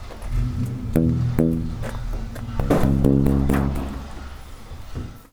Sound effects > Objects / House appliances
Junkyard Foley and FX Percs (Metal, Clanks, Scrapes, Bangs, Scrap, and Machines) 118
Ambience, Atmosphere, Bang, Bash, Clang, Clank, Dump, dumping, dumpster, Environment, Foley, FX, garbage, Junk, Junkyard, Machine, Metal, Metallic, Perc, Percussion, rattle, Robot, Robotic, rubbish, scrape, SFX, Smash, trash, tube, waste